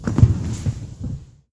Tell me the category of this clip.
Sound effects > Objects / House appliances